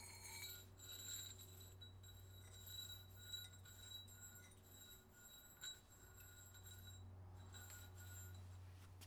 Soundscapes > Urban
metal tubes concrete
Small small metal tube softly brushed against concrete. Recorder with a Zoom h1n.
brushed, metal, tube